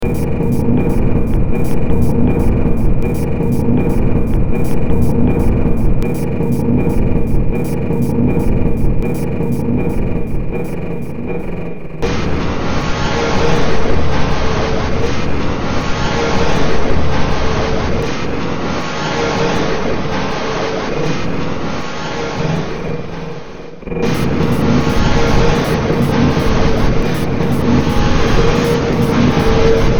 Music > Multiple instruments
Short Track #3866 (Industraumatic)
Ambient, Cyberpunk, Games, Horror, Industrial, Noise, Sci-fi, Soundtrack, Underground